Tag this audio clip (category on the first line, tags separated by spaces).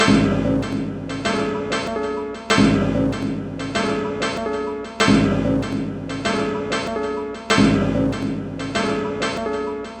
Instrument samples > Percussion
Alien Loopable Loop Drum Ambient Weird Dark Soundtrack Underground Industrial Samples Packs